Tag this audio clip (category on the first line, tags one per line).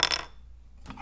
Sound effects > Objects / House appliances
bonk
fieldrecording
fx
glass
hit
industrial
metal
natural
perc
percussion
sfx